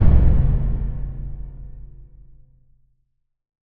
Sound effects > Electronic / Design
UNDERGROUND SUBMERGED BOOM
GRAND, CINEMATIC, MOVIE, IMPACT, BASSY, LOW